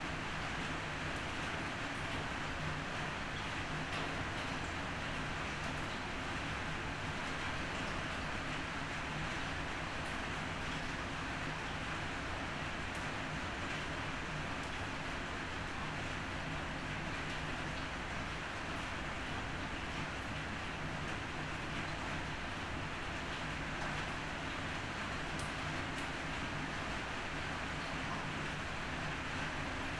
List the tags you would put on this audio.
Sound effects > Natural elements and explosions
Philippines weather drops roof metallic rainfall cement field-recording white-noise backyard night relaxing rain rainy dripping soundscape atmosphere

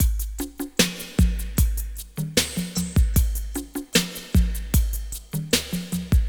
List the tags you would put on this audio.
Music > Solo percussion
1lovewav loop 80s-drums electronic analog 80s drums electro 108bpm

